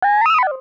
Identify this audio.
Sound effects > Other mechanisms, engines, machines
A talkative sounding small robot, bleeping. I originally designed this for some project that has now been canceled. Designed using Vital synth and Reaper